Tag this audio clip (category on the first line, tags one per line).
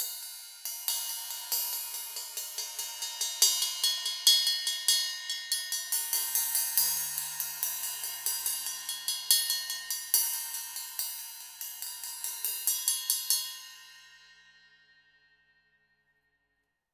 Music > Solo instrument
Oneshot
Percussion
Hat